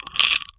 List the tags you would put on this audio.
Sound effects > Objects / House appliances
shake
open
meds
pill
Pills
medicine
drugs
close
bottle
plastic
shaking

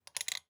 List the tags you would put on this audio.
Sound effects > Other mechanisms, engines, machines
crunch
garage
noise
sample

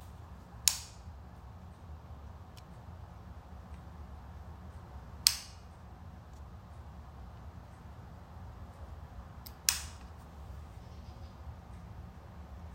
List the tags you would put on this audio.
Sound effects > Objects / House appliances
bulb
household

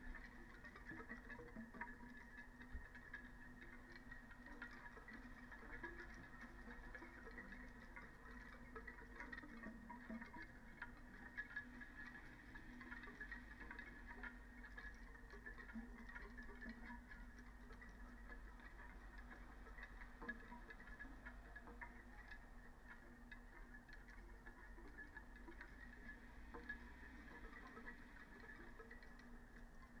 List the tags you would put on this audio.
Soundscapes > Nature
raspberry-pi
alice-holt-forest
nature
weather-data
phenological-recording

